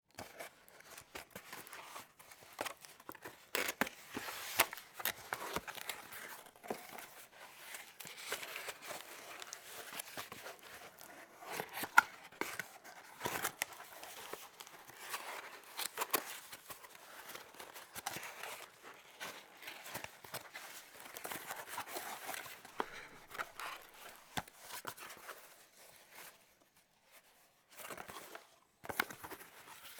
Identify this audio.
Sound effects > Objects / House appliances
A recording of cardboard being handled and ripped. Recorded using Zoom F3. Rode NTG4. Dual Mono.
ripping, rustling, handled, ripped, cardboard, hands